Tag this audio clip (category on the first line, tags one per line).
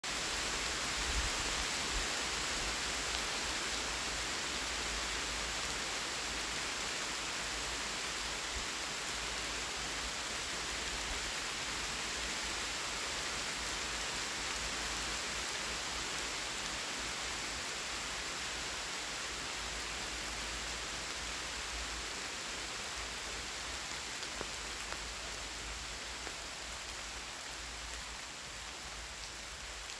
Soundscapes > Nature
ambience
birds
rural
rain